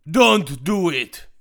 Speech > Solo speech
dont do it
warning, voice, cartoon, videogame, speech